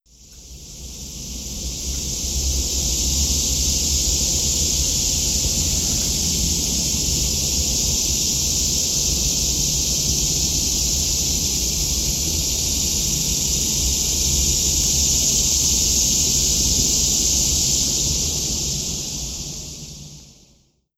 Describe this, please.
Sound effects > Animals
ANMLInsc-Samsung Galaxy Smartphone, CU Cicada, Long, Loud Song Nicholas Judy TDC
A long, loud cicada song.